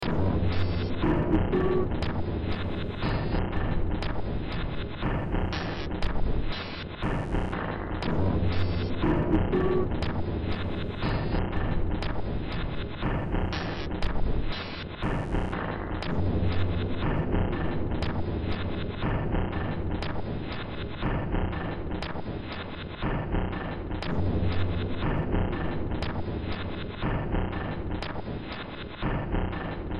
Music > Multiple instruments
Demo Track #3531 (Industraumatic)
Industrial, Games, Cyberpunk, Ambient, Soundtrack, Horror, Noise, Underground, Sci-fi